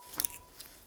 Sound effects > Objects / House appliances
Coin Foley 3
coin foley coins change jingle tap jostle sfx fx percusion perc
coin, foley